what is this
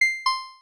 Sound effects > Electronic / Design
UI SFX created using Vital VST.
button, digital, interface, menu, notifications, options, UI